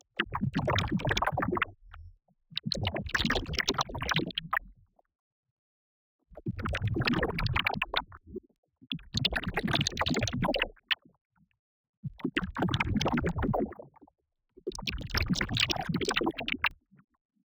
Sound effects > Electronic / Design
Fx-Liquid Woosh FX 3
Botanica, FX, Glitch, Liquid, Water, Woosh